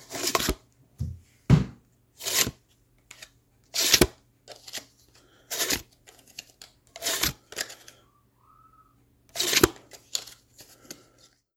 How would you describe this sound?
Sound effects > Objects / House appliances
GAMEMisc-Samsung Galaxy Smartphone, CU Card, Swipe Out of Dealer Shoe Nicholas Judy TDC
A card swiping out of a dealer shoe.
dealer, card, Phone-recording, shoe, foley, swipe